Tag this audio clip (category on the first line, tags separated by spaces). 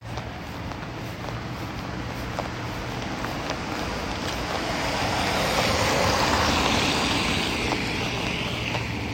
Sound effects > Vehicles
car road tire